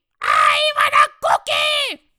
Sound effects > Animals
i wanna cookie parrot
angry, bird, cookie, parrot, tropical